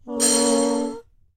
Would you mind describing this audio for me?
Sound effects > Other
combo ice holy
36 - Combined Ice and Holy Spells Sounds foleyed with a H6 Zoom Recorder, edited in ProTools together
combination, holy, ice, spell